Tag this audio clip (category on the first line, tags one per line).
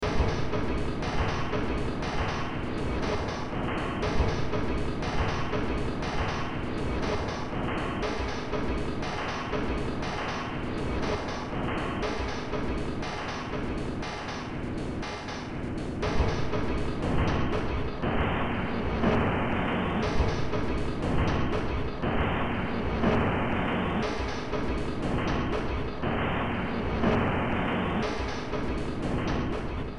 Music > Multiple instruments
Games
Cyberpunk
Horror
Soundtrack
Ambient
Underground
Noise
Industrial
Sci-fi